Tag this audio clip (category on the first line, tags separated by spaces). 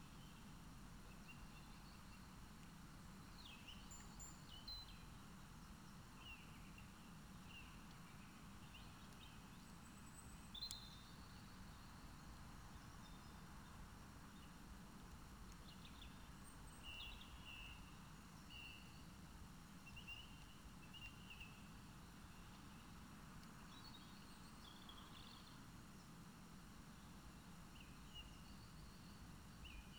Soundscapes > Nature
soundscape raspberry-pi natural-soundscape phenological-recording alice-holt-forest nature field-recording meadow